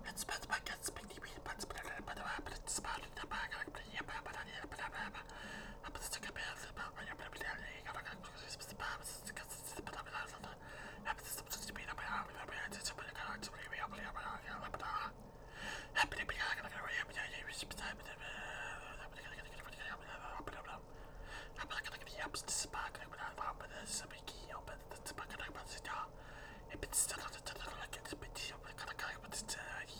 Speech > Solo speech
VOXWhsp-Blue Snowball Microphone, MCU Whispering Nicholas Judy TDC
quiet, Blue-brand, male